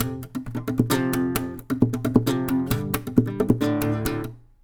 Music > Solo instrument
acoustic guitar slap and pop riff 2
acosutic, chord, chords, dissonant, guitar, instrument, knock, pretty, riff, slap, solo, string, strings, twang